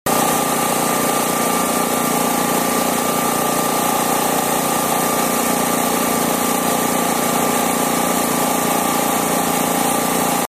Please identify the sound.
Sound effects > Vehicles
Diesel generator - sound noise.
diesel, motor